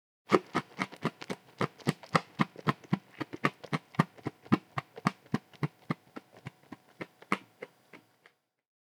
Sound effects > Other
FOODEat Cinematis RandomFoleyVol2 CrunchyBites SaltySticksBite ClosedMouth FastChew Freebie
bites, sound, rustle, effects, salty, recording, texture, handling, sticks, bag, food, bite, foley, crunchy, SFX, snack, postproduction, plastic, crunch, design